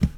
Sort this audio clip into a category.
Sound effects > Objects / House appliances